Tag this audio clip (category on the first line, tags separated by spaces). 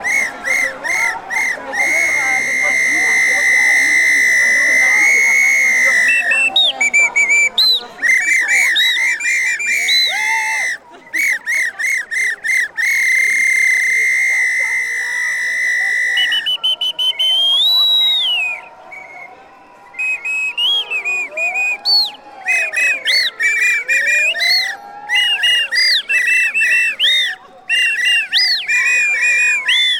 Soundscapes > Urban
streets voices antifascist strike manifestation general brussels demonstration